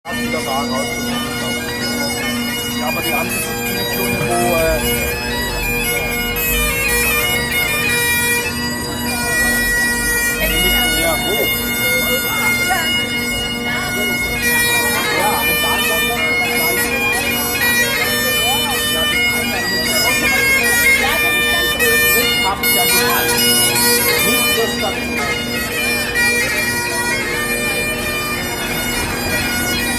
Instrument samples > Other
a Scottish musician
field-recording, artist